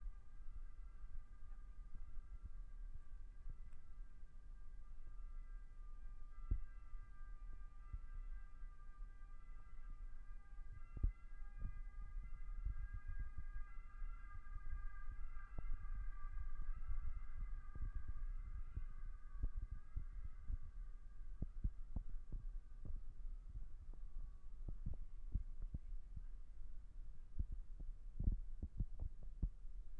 Soundscapes > Nature
STeDe tree ex macello 11.38am
Recorded with zoom H1 essential
Padova, FieldRecording